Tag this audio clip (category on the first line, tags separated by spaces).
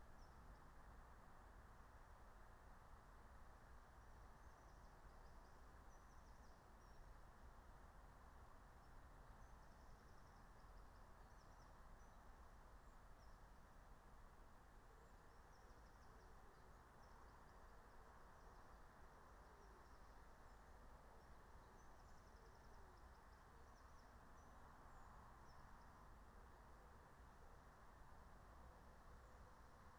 Soundscapes > Nature
alice-holt-forest meadow nature phenological-recording raspberry-pi